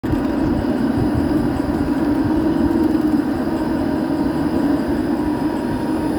Sound effects > Vehicles
13tram intown
A tram is passing by in the city center of Tampere. Recorded on a samsung phone.
city, traffic